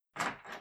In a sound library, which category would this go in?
Sound effects > Objects / House appliances